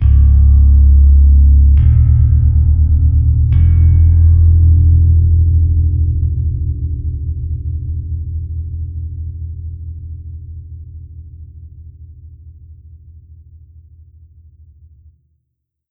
Instrument samples > String
Night Start
horror, creepy